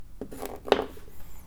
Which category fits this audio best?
Sound effects > Other mechanisms, engines, machines